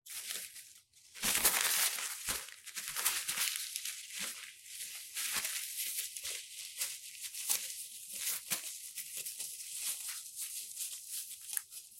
Sound effects > Human sounds and actions
Crumple of paper towel.